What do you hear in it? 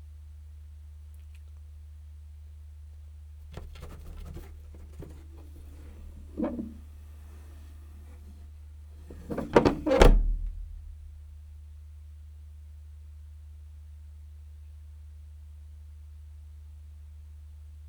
Sound effects > Animals
Cat-flap 1
Subject : A cat flap. it's in between two other doors/cat flaps the cats need to go though. Date YMD : 2025 September 04 Location : Gergueil 21410 Bourgogne-Franche-Comté Côte-d'Or France. Hardware : DJI Mic 3 TX. Onboard recorder "Original" / raw mode. Weather : Processing : Trimmed and normalised in Audacity.
omni, pet-door